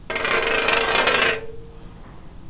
Sound effects > Other mechanisms, engines, machines
Handsaw Tooth Teeth Metal Foley 5
foley, fx, handsaw, hit, household, metal, metallic, perc, percussion, plank, saw, sfx, shop, smack, tool, twang, twangy, vibe, vibration